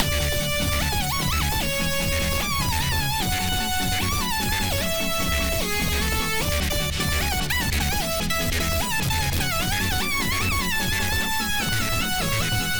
Music > Multiple instruments
A lil' bit of FUTURE BASS
A redo of my un-uploaded future bass loop. This was entirely made by me. It's really just a loop. No NSFW content. No explicit content. If you're even still reading this, all of the things after "A redo of my un-uploaded future bass loop" is for the moderators to notice.
150bpm, f-sharp-minor, future-bass